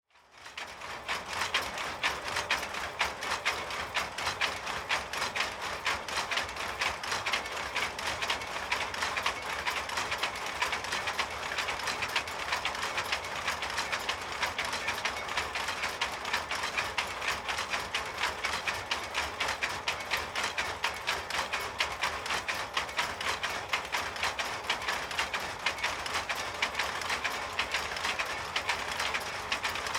Soundscapes > Indoors
Traditional Korean Textile Machines – Ganghwa Workshop Rhythms
UID: KR-GANGHWA-251108-1130-006 Recorded at the Sochang Experience Center in Ganghwa-eup, Ganghwa Island, Korea. Two traditional textile weaving machines operate side by side, each following its own rhythm, converging and separating in stereo space.
machinery, textile, looms, weaving, korea, factory, field-recording, rhythm, stereo, ganghwa